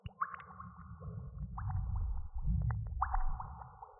Sound effects > Electronic / Design
A WaterDroplet sound made with U-he Zebra and processed through various GRM plugins, also the Reverb is made using The Valhalla plugins